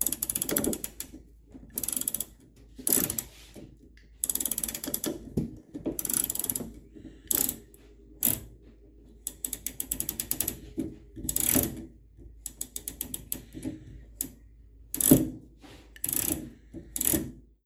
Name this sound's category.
Sound effects > Objects / House appliances